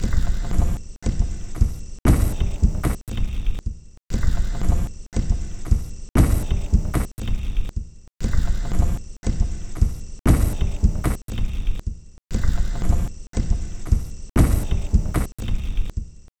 Instrument samples > Percussion
This 117bpm Drum Loop is good for composing Industrial/Electronic/Ambient songs or using as soundtrack to a sci-fi/suspense/horror indie game or short film.
Industrial, Loop, Loopable, Alien, Ambient, Samples, Drum, Underground, Weird, Packs, Soundtrack, Dark